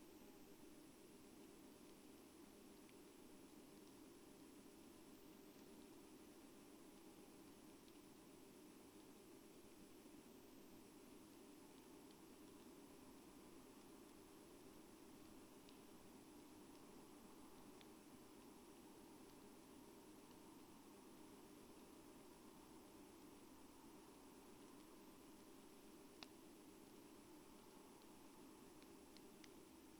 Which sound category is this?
Soundscapes > Nature